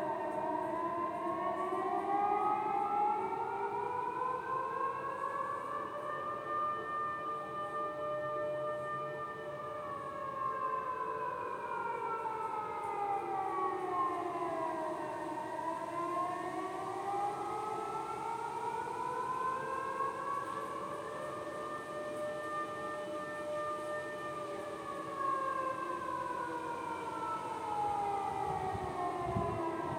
Sound effects > Other mechanisms, engines, machines
Raw recording of a civil defense siren. Recorded on a phone in Belgorod on 06/26/2025.
air-raid, alarm, alert, civil, defence, defense, siren, warning